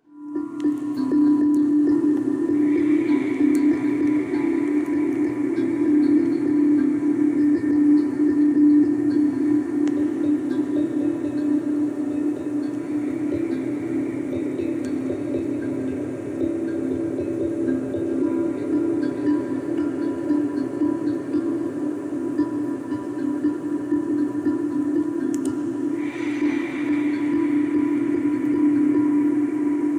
Music > Solo percussion
The Bear
Handpan, Chill, HangDrum, Percussion, Tuned, Relaxing, Outdoors